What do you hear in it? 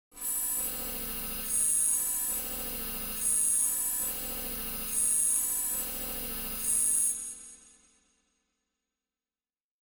Sound effects > Experimental
Recorded in various ways, with way to many sound effects on them